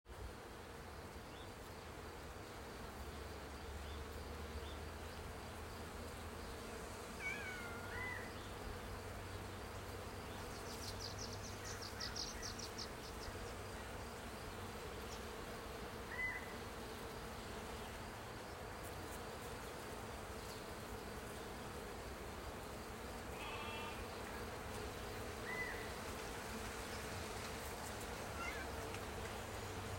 Soundscapes > Nature
Hawthorn ambience 04/22/2022
Magical sound around hawthorn tree in full bloom
farm-land, cat, sound-tree, hawthorn, bees, field-recordings, Sounds-trees, psychedelic, fairy-tree